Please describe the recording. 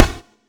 Instrument samples > Percussion
snare tx hollow 1
A hollow snare to be used as an attack trigger. I emptied frequencies from many of my older snares (see my snare folder). Use it attenuated. It's just a snare-trigger.